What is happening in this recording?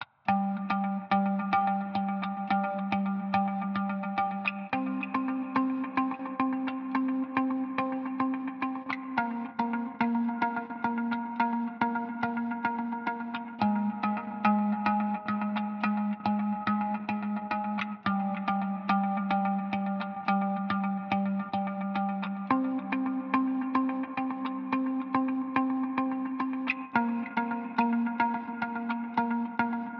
Music > Solo instrument
108 - Break Free Guitar Arp
An instrumental peice i have made in Kontakt with a the guitar series plus an additional amp. I dont know the exact guitar type and preset i have modified, as it is a past project from 2023. With the amp it has a much more percussive character then a typical guitar sound. Have fun Thomas
Nativeinstruments, music, tonfabrik, bitwigstudio, breakfree, Guitar, motionpicture, instrumental, break, amped